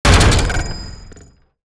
Sound effects > Other
Sound Design Elements Impact SFX PS 083
Effects recorded from the field.
audio, blunt, cinematic, collision, crash, design, effects, explosion, force, game, hard, heavy, hit, impact, percussive, power, rumble, sfx, sharp, shockwave, smash, sound, strike, thudbang, transient